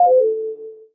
Sound effects > Electronic / Design
Sine Aww
A sweet lil ringtone/chime, made in Ableton, processed in Pro Tools. I made this pack one afternoon, using a single sample of a Sine wave from Ableton's Operator, stretching out the waveform, and modulating the pitch transposition envelope.
bleep, chirp, gui, processed